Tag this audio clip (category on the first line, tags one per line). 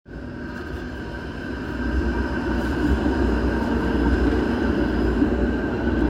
Sound effects > Vehicles
city
public-transport